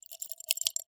Sound effects > Objects / House appliances
Jewellerybox Shake 11 Texture
Shaking a ceramic jewellery container, recorded with an AKG C414 XLII microphone.
jewellery, trinket-box